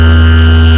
Instrument samples > Synths / Electronic
Low bass with ring filter, a low bass sound messed around with using a ring filter
bass, distortion, electro, idm, lowbass, ringfilter, subbass, synth, techno